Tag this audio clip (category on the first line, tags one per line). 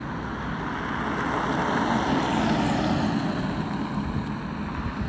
Soundscapes > Urban
city
driving